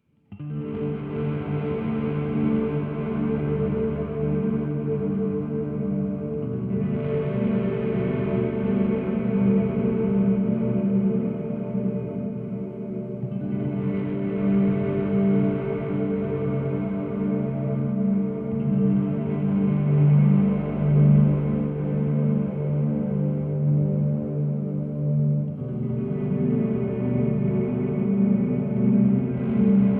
Music > Solo instrument
How much longer will there be forests to walk through?
fuzzy, distortion, drone, lofi, dreamy, chords, ambient, shoegaze, guitar